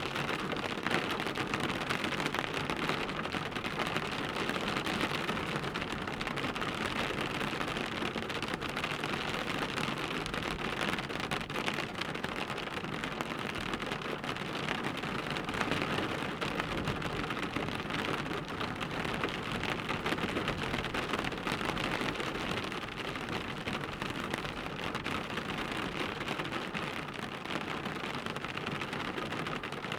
Soundscapes > Nature
Sound of rain and hail from inside a parked minivan. Zoom H4N Silver Jack, Colorado